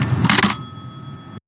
Sound effects > Objects / House appliances
Phone Retro
homephone, telephone, phone